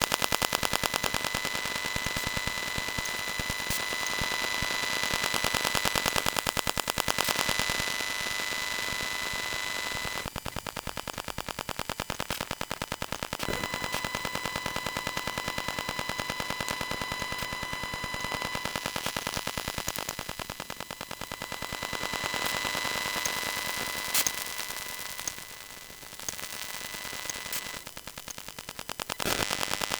Sound effects > Objects / House appliances
Electromagnetic Field Recording of WiFi Hotspot Alcatel HH71VM
Electromagnetic field recording of a WiFi Hotspot Alcatel HH71VM Electromagnetic Field Capture: Electrovision Telephone Pickup Coil AR71814 Audio Recorder: Zoom H1essential